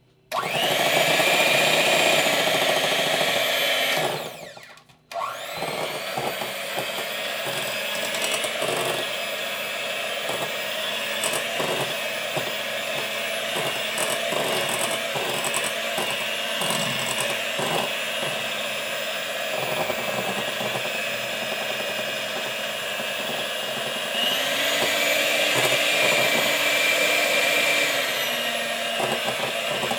Sound effects > Objects / House appliances
A hand mixer recorded on a phone.